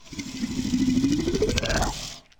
Sound effects > Experimental
Creature Monster Alien Vocal FX (part 2)-055
bite, Monster, zombie, howl, fx, Alien, dripping, grotesque, devil, growl, otherworldly, snarl, mouth, weird, Sfx, Creature, gross, demon